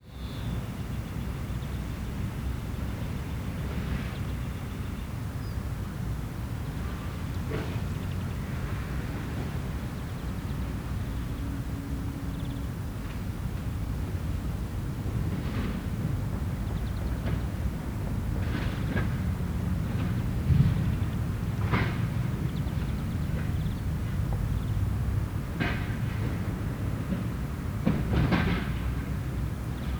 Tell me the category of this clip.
Soundscapes > Urban